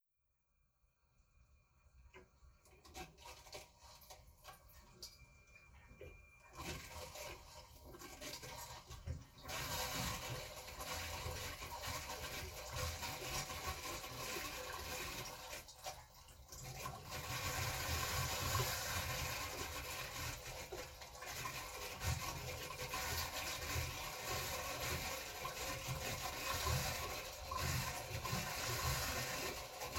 Sound effects > Objects / House appliances
WATRPlmb-CU Toilet, Malfunction Nicholas Judy TDC
cartoon, Phone-recording, toilet